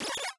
Sound effects > Electronic / Design
EXPERIMENTAL RUBBERY SYNTHETIC PROMPT
BEEP, BOOP, CHIPPY, CIRCUIT, COMPUTER, ELECTRONIC, EXPERIMENTAL, HARSH, HIT, INNOVATIVE, SHARP, SYNTHETIC